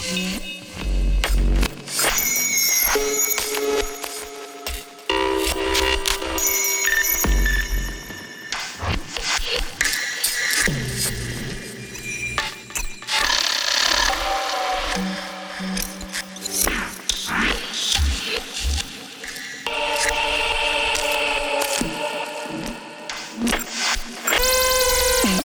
Sound effects > Experimental
Glitch Percs 7 space groover
abstract, alien, clap, crack, edm, experimental, fx, glitch, glitchy, hiphop, idm, impact, impacts, laser, lazer, otherworldy, perc, percussion, pop, sfx, snap, whizz, zap